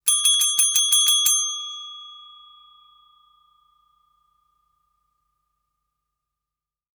Sound effects > Objects / House appliances
The receptionist rings the bell eight times.
hall, motel, reception, service